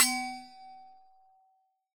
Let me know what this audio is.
Sound effects > Objects / House appliances
Resonant coffee thermos-009
sampling, percusive, recording